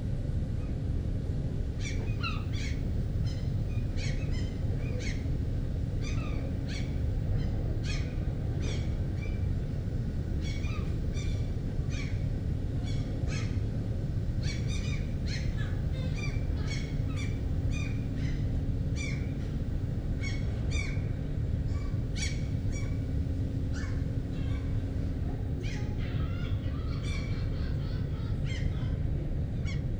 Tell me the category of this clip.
Soundscapes > Other